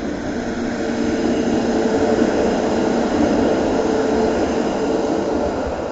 Soundscapes > Urban
Passing Tram 22
city, field-recording, outside, street, traffic, tram, trolley, urban